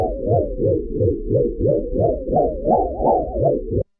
Sound effects > Electronic / Design
Just a sound I created on Audacity while experimenting with various filters.
artificial, electronic, experimental, oscillation, pad, pulse, synth